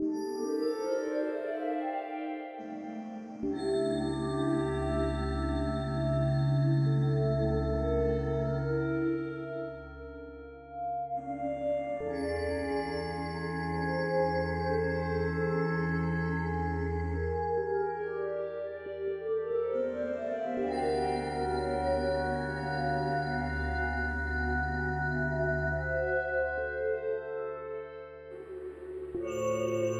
Music > Multiple instruments
Mysterious Loop
A loop I made in LMMS for aquatic sounds, which creates mystery and a bit of fear.
ambient, Background, loop, mysterious, water